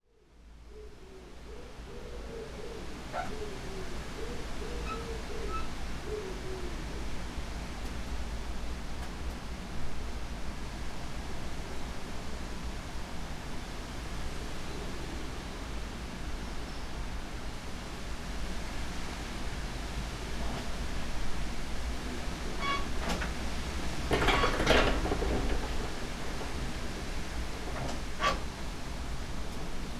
Soundscapes > Nature
An early morning recording from my back garden.